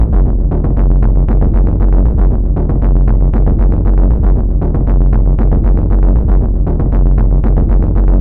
Instrument samples > Synths / Electronic
This 234bpm Synth Loop is good for composing Industrial/Electronic/Ambient songs or using as soundtrack to a sci-fi/suspense/horror indie game or short film.
Loopable, Weird, Packs, Samples, Industrial, Dark, Underground, Drum, Loop